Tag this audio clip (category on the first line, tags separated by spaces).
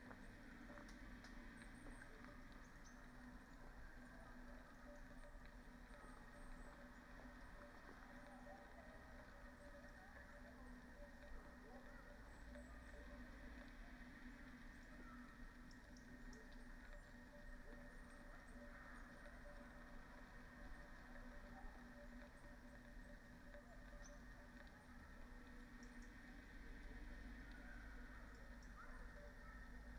Soundscapes > Nature
nature weather-data soundscape modified-soundscape natural-soundscape raspberry-pi field-recording data-to-sound phenological-recording artistic-intervention